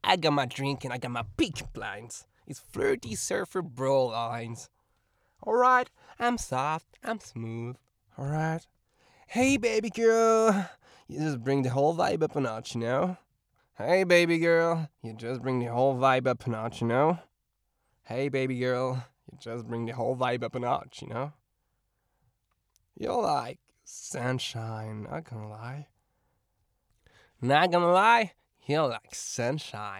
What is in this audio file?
Speech > Solo speech
Surfer dude - kit - Flirty (kit) 1
Subject : Recording my friend going by OMAT in his van, for a Surfer like voice pack. Date YMD : 2025 August 06 Location : At “Vue de tout Albi” in a van, Albi 81000 Tarn Occitanie France. Shure SM57 with a A2WS windshield. Weather : Sunny and hot, a little windy. Processing : Trimmed, some gain adjustment, tried not to mess too much with it recording to recording. Done in Audacity. Some fade in/out if a one-shot. Notes : Tips : Script : "Hey baby girl… you just bring the whole vibe up a notch, y’know?" "You’re like… sunshine, not gonna lie." "You got eyes like the ocean, and I get lost in 'em." "Wanna go watch the sunset or just vibe next to it together?" "You walk in, and it’s like the playlist just hits better."